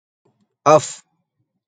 Sound effects > Other
fa-sisme
In Tajwid and Arabic phonetics, the place where a letter is pronounced is called "makhraj" (مَخْرَج), which refers to the specific point in the mouth or throat where a sound originates. The correct identification of the makhraj is crucial to ensure accurate pronunciation of Arabic letters, especially in the recitation of the Qur'an. This is my own voice. I want to put it as my audio html project.
sound, voice